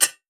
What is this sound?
Sound effects > Electronic / Design
Closed Hi Hat
This is a closed Hi-Hat sound that I made myself.
Closed, Drum, Drums, Hat, Hi, Hihat, Hi-Hat, Livedrums, Loop, Music, Percussion, Sound